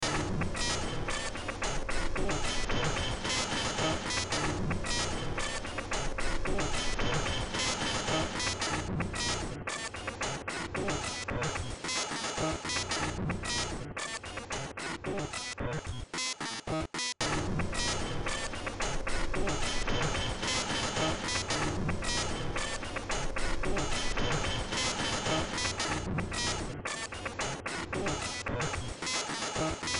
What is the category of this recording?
Music > Multiple instruments